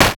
Instrument samples > Percussion
8 bit-Noise Snare 2
game, 8-bit, FX, percussion